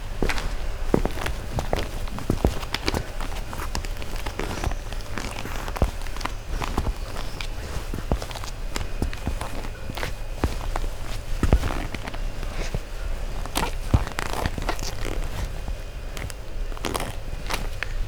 Sound effects > Human sounds and actions
Rubbery shoes MKE600
Subject : Walking indoors with my shoes on some tiles. Date YMD : 2025 June 29 Sunday Morning (07h30-08h30) Location : Albi 81000 Tarn Occitanie France. Sennheiser MKE600 with stock windcover P48, no filter. Weather : Sunny no wind/cloud. Processing : Trimmed in Audacity. Notes : Cheap walking shoes from decathlon, probably 3-4 year old by now... Tips : With the handheld nature of it all. You may want to add a HPF even if only 30-40hz.
floor, rubber, footsteps, indoor, MKE-600, light